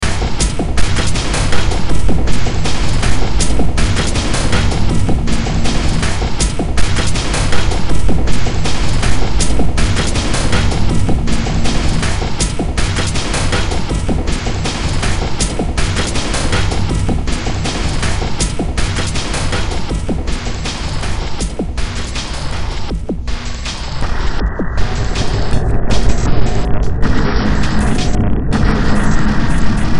Music > Multiple instruments
Demo Track #3498 (Industraumatic)
Ambient, Cyberpunk, Games, Horror, Industrial, Noise, Sci-fi, Soundtrack, Underground